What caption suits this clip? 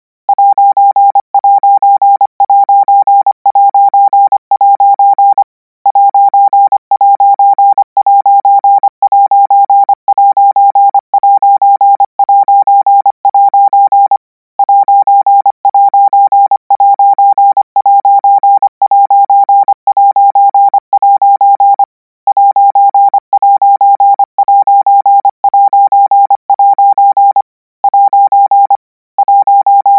Sound effects > Electronic / Design
Koch 53 ' - 200 N 25WPM 800Hz 90%
Practice hear symbol ''' use Koch method (practice each letter, symbol, letter separate than combine), 200 word random length, 25 word/minute, 800 Hz, 90% volume.